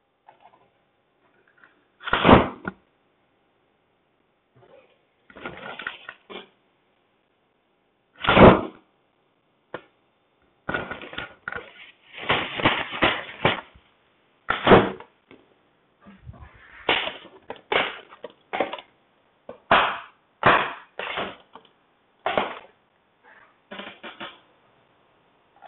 Sound effects > Objects / House appliances
Umbrella opening and hitting against the floor.